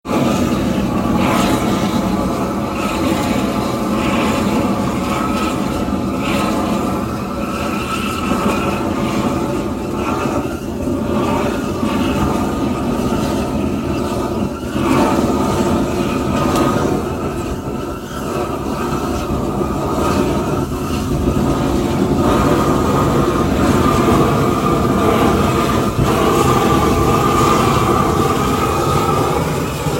Soundscapes > Nature
Veva flame weeding 05/01/2023
rural-life, farm, flame-weeding